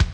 Instrument samples > Percussion
same description in the snaredrum.